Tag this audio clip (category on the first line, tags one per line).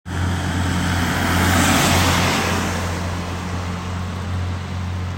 Sound effects > Vehicles
car
field-recording
tampere